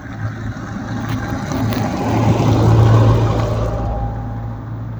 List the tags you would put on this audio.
Sound effects > Vehicles
car vehicle automobile

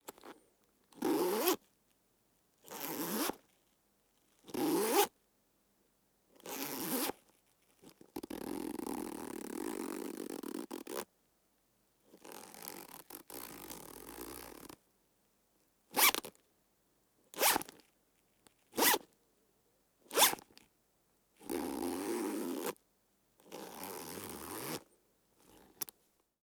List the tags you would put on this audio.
Sound effects > Objects / House appliances
whack fastening crack fly

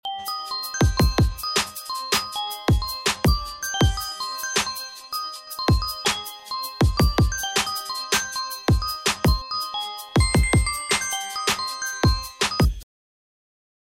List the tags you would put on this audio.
Music > Multiple instruments
Ambiance Music Melody Piano Hearty Tracks Loop Beats Track Beaty

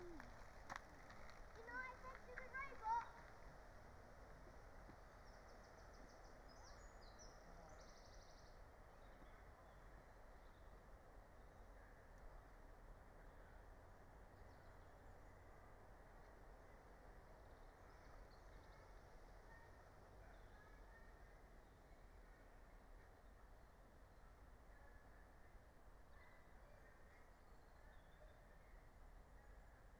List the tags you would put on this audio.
Soundscapes > Nature
raspberry-pi nature meadow natural-soundscape field-recording alice-holt-forest soundscape phenological-recording

